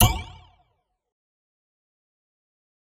Sound effects > Experimental
Zero-G Racquet Hit 4
A failed attempt to make some other material led to the creation of these satisfying impact sounds. I imagined a blisteringly fast, zero-gravity sports game where athletes wield electric racquets/bats and hit floating spheres. (Or something else if you prefer.) Fun fact: The samples I put various through filters here were recordings of me playing a tiny kalimba.
z-ball, sci-fi-weapon-block, sci-fi-sports, sci-fi-athletics, sci-fi-baseball, sci-fi-racquet-sports, futuristic-sport, zero-g-sports, futuristic-sports, sci-fi-sport, sci-fi-sports-sounds, space-travel-sport, sci-fi-racquet, sci-fi-hit, sci-fi-weapon, sci-fi-collision, energy-shield-collision, sci-fi-weapon-parry, sci-fi-bludgeon-hit, laser-racquet-hit, energy-shield, sci-fi-racquet-hit, sports-of-the-future